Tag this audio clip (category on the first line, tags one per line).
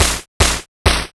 Instrument samples > Percussion
Snare
8-bit
Game
8bit